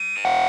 Sound effects > Electronic / Design
A fun lil computer error beep, super short, and made to resemble old technology. Sounds like a glitched-out fax ringback. Think I made this by speeding up a Behringer Deepmind12 bass.
computer, technology, lo-fi, beepy, ux, beep, sfx, glitch